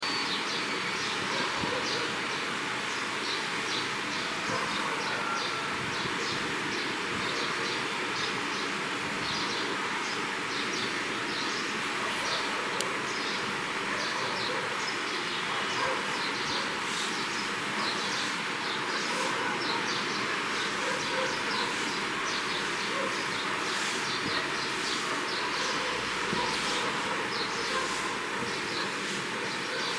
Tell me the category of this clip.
Sound effects > Natural elements and explosions